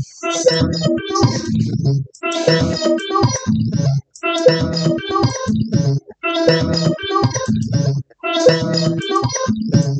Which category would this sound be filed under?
Sound effects > Electronic / Design